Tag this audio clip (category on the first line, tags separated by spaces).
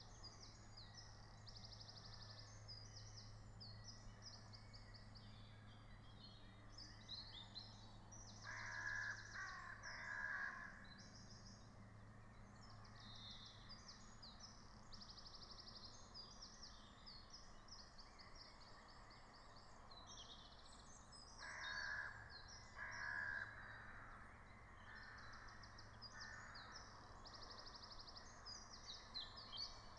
Soundscapes > Nature
natural-soundscape field-recording nature soundscape phenological-recording alice-holt-forest raspberry-pi meadow